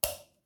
Sound effects > Objects / House appliances
Switch off 3
Simple sound effect of me pressing my bedroom light switch, it has a little echo in the background but can be removed by using an audacity plugins.
press, switch, click, button